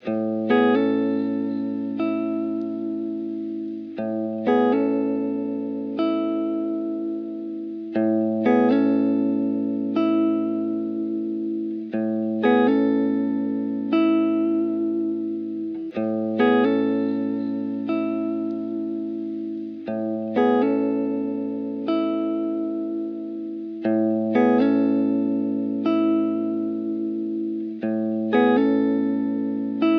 Music > Solo instrument
Guitar loops 126 01 verison 01 60.4 bpm
bpm, electric, electricguitar, free, guitar, loop, music, reverb, samples, simple, simplesamples